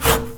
Sound effects > Other mechanisms, engines, machines
Handsaw Oneshot Hit Stab Metal Foley 13
fx, household, metal, metallic, perc, shop, smack, twang, twangy, vibe, vibration